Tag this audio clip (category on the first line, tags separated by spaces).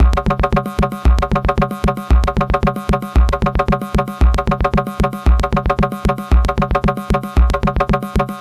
Music > Solo percussion
606 Analog Bass Drum DrumMachine Electronic Kit Loop Mod Modified music Synth Vintage